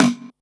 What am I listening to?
Instrument samples > Percussion
Hyperrealism V9 Snare
machine, digital, drums, stereo, snare, one-shot, drum, sample